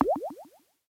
Sound effects > Electronic / Design
Droplet SFX
Kind of a funny sound! Like a droplet hitting water. Made using a Yamaha TX7.